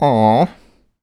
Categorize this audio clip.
Speech > Solo speech